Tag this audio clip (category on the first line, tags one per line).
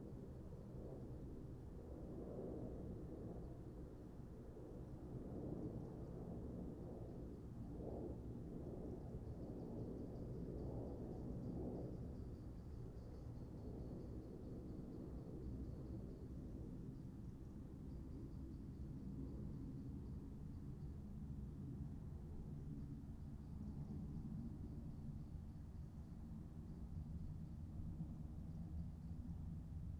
Soundscapes > Nature
soundscape
Dendrophone
nature
data-to-sound
weather-data
phenological-recording
artistic-intervention
modified-soundscape
raspberry-pi
alice-holt-forest
field-recording
sound-installation
natural-soundscape